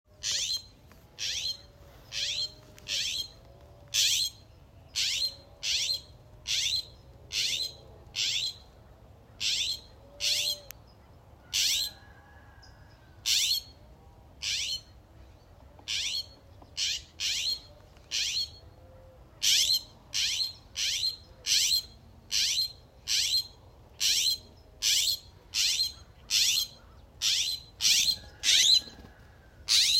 Soundscapes > Nature
Blue jay and cat 07/01/2024
Blue Jay and Cat
cat, farm, Blue-jay